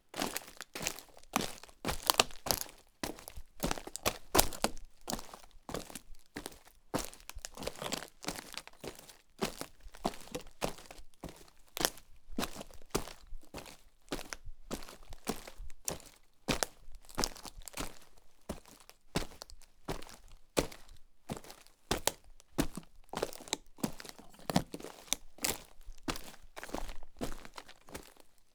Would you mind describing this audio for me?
Sound effects > Human sounds and actions
Warlking on dry bark crunch (woody gravel) XY 2
Subject : Hand held recording of me walking on some tree bark and dusty ground in a barn where we keep the wood. Date YMD : 2025 04 22 Location : Gergueil Indoor, inside a barn where we keep the wood. Hardware : Tascam FR-AV2 Rode NT5 XY mode. Weather : Processing : Trimmed and Normalized in Audacity.
foot, foot-steps, FR-AV2, NT5, Rode, Walking, woody